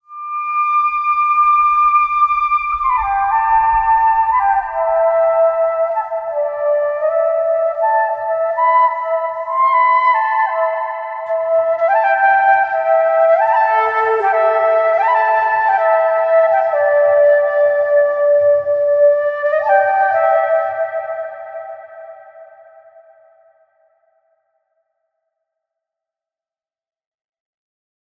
Music > Solo instrument
Flute Chamber (beautiful winds deep reverb)
An Orchestral Flute with a large thick echo, performed by RJ Roush in CVLT Studios of Humboldt California. Recorded with a Beta 57A Microphone through an AudioFuse interface in Reaper. Further processing via Reaper and Fab Filter.
ambience, ambient, atmosphere, authentic, bamboo, beautiful, big, chamber, chill, classical, composition, deep, distant, echo, flute, forest, hall, instrument, large, native, natural, nature, orchestral, reverb, room, solo, studio-recording, theater, wind, winds